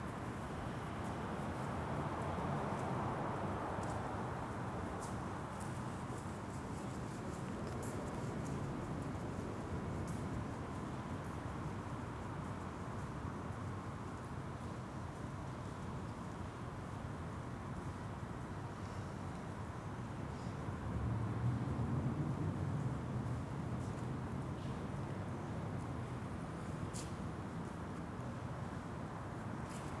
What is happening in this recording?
Soundscapes > Other
Riding the local light rail train from downtown to the nearest stop to my home late in the evening. A fairly empty train with mostly just the train and some various announcements.
ambience, city, field-recording, light-rail, rail, station, train, urban